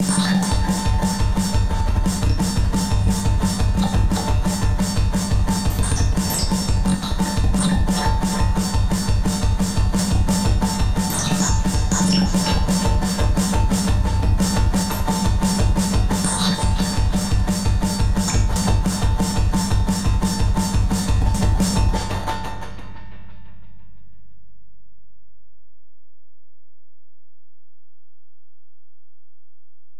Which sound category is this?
Instrument samples > Percussion